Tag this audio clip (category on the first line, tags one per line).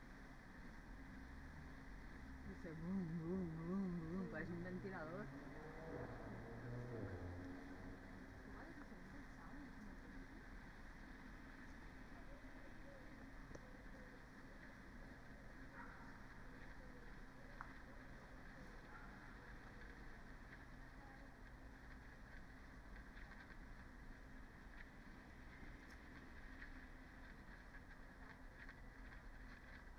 Soundscapes > Nature
modified-soundscape
sound-installation
weather-data
soundscape
alice-holt-forest
artistic-intervention
raspberry-pi
nature
field-recording
data-to-sound
phenological-recording
Dendrophone
natural-soundscape